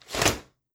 Sound effects > Objects / House appliances
OBJUmbr-Samsung Galaxy Smartphone, CU Flap Open Nicholas Judy TDC
An umbrella flapping open.
open, foley, Phone-recording, flap, umbrella